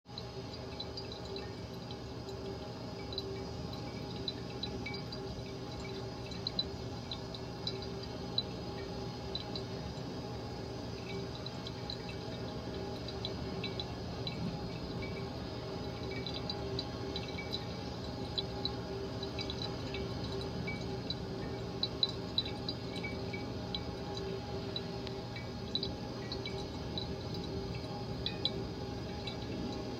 Soundscapes > Other
Still hum shine drops 01/29/2023
Still hum shine drops